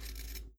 Sound effects > Objects / House appliances
A single toast scrape.